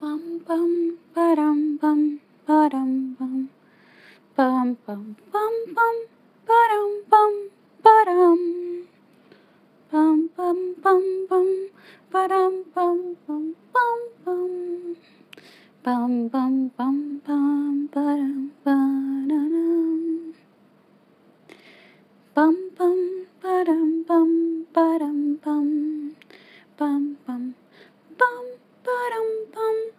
Music > Other
Tareareo mujer Santa Cruz de la Sierra Bolivia
Voice of a young woman humming.
Bolivia, de, hum, recording, field, America, South, la